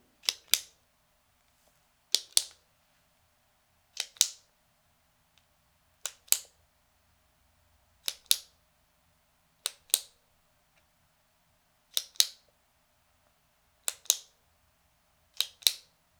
Sound effects > Objects / House appliances
A pen clicking slowly. Pens. Clicks. A bored student. An annoying office coworker. A focused doctor. Anything you want! You're welcome! :) Recorded on Zoom H6 and Rode Audio Technica Shotgun Mic.
doctor, home, office, papers, pen, pens, school, students